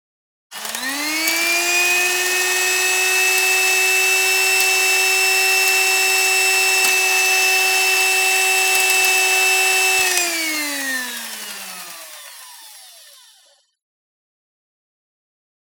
Sound effects > Objects / House appliances
mixer-speed-5
A kitchen mixer running at the speed 5. Recorded with Zoom H6 and SGH-6 Shotgun mic capsule.